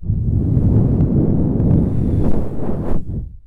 Speech > Other
My kid blowing into the microphone Recorded with a Tascam Portacapture X6